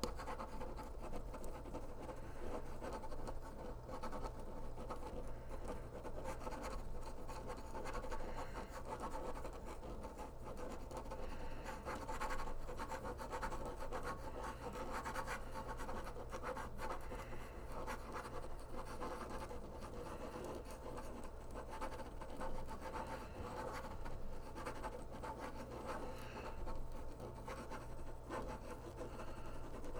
Sound effects > Objects / House appliances
OBJWrite-Blue Snowball Microphone Retractable Pen, Writing Nicholas Judy TDC
A retractable pen writing.
write, foley, Blue-brand, Blue-Snowball, retractable-pen